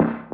Instrument samples > Synths / Electronic
CVLT BASS 129
bass, bassdrop, clear, drops, lfo, low, lowend, stabs, sub, subbass, subs, subwoofer, synth, synthbass, wavetable, wobble